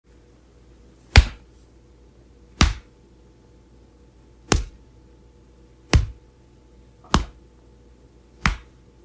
Sound effects > Human sounds and actions
Sound made by punching my thigh. Was recorded with an iPhone SE and edited with Audacity.